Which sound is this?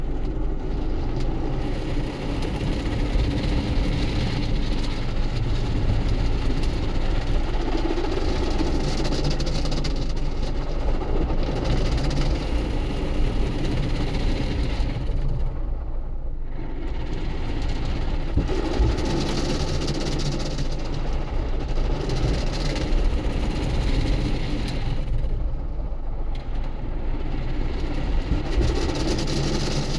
Sound effects > Other
The sound of an automatic car wash from inside the car recorded on my phone microphone the OnePlus 12R
automatic-car-wash, car